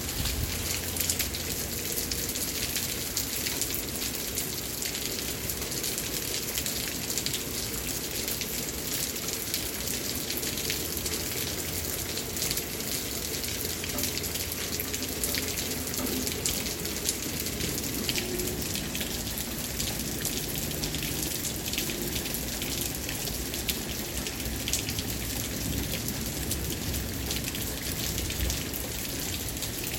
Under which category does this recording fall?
Soundscapes > Nature